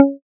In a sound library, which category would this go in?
Instrument samples > Synths / Electronic